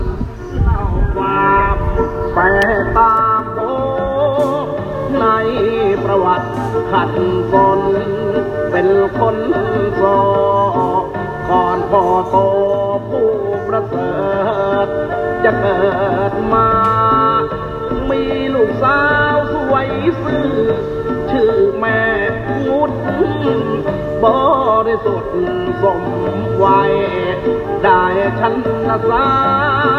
Soundscapes > Urban
Temple Music, Wat Intharawihan, Bangkok, Thailand (Feb 21, 2019)
Background music recorded at the Giant Buddha Temple (Wat Intharawihan) in Bangkok, Thailand. Includes ambiance.
ambient, Bangkok, bells, chanting, Intharawihan, music, ritual, sacred, temple, Thailand, Wat